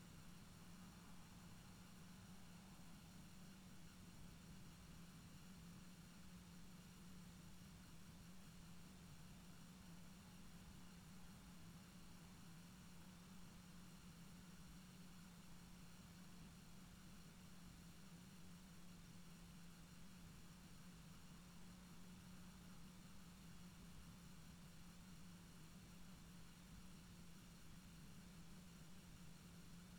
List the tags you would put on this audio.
Soundscapes > Nature
field-recording natural-soundscape soundscape phenological-recording meadow nature alice-holt-forest raspberry-pi

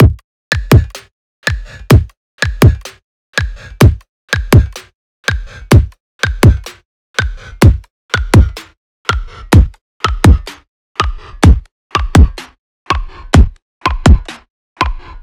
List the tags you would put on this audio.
Music > Multiple instruments
126bpm beat break breakbeat drums loop midtempo picthmod pitchdown processed reverb room simplebeat soft

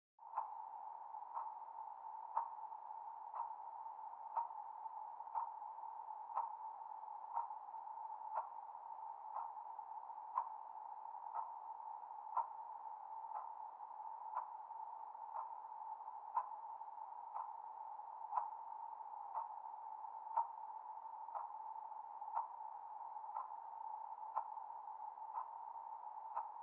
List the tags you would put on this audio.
Sound effects > Experimental
loop galaxy monotonous creepy disturbing space wind sci-fi

